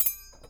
Sound effects > Objects / House appliances
Metal Tink Oneshots Knife Utensil 1
Vibrate, Klang, FX, ding, Wobble, Trippy, Vibration, SFX, Foley, Clang, Perc, Beam, Metal, metallic, ting